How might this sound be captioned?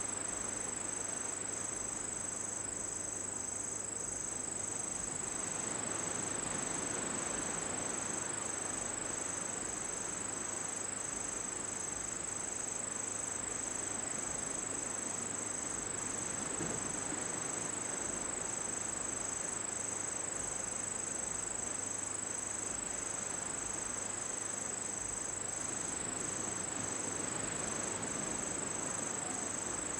Soundscapes > Nature
Evening ambient sound recorded in front of a house near the beach in Goa, India. Crickets chirp steadily while distant sea waves roll in the background. Calm, tropical, and peaceful—ideal for coastal or nature scenes.